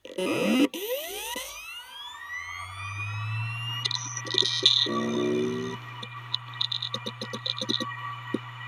Sound effects > Other mechanisms, engines, machines
1TB Seagate HDD Startup Sound
The sound of my 1 TB of Seagate HDD Starting up. Recorded from my phone TECNO SPARK 20C.